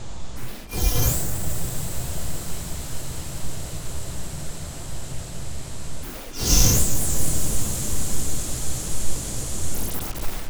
Sound effects > Electronic / Design
Martian Wind Tunnel
sci-fi, free, scifi, industrial-noise, noise, sound-design, creative, royalty, commons